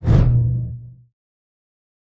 Sound effects > Other
Sound Design Elements Whoosh SFX 045
ambient, audio, cinematic, design, dynamic, effect, effects, element, elements, fast, film, fx, motion, movement, production, sound, sweeping, swoosh, trailer, transition, whoosh